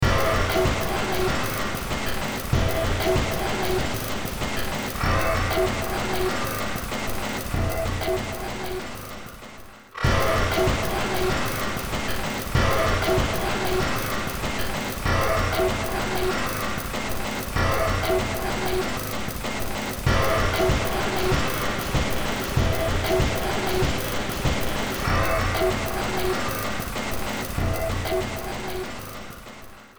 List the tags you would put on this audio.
Music > Multiple instruments
Ambient
Sci-fi
Soundtrack
Horror
Industrial
Noise

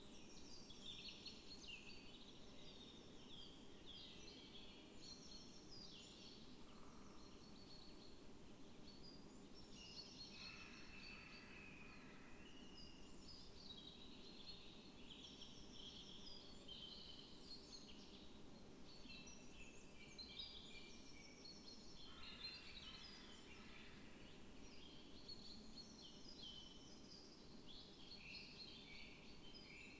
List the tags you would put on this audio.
Soundscapes > Nature
nature
field-recording
artistic-intervention
data-to-sound
modified-soundscape
phenological-recording
alice-holt-forest
sound-installation
weather-data
raspberry-pi
soundscape
Dendrophone
natural-soundscape

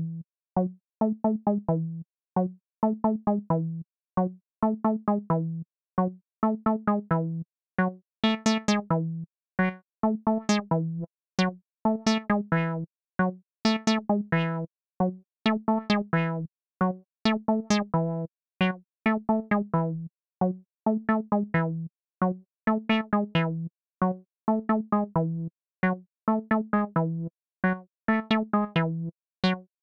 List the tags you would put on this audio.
Music > Solo instrument
303
Acid
electronic
hardware
house
Recording
Roland
synth
TB-03
techno

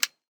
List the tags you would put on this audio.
Sound effects > Human sounds and actions
click
button

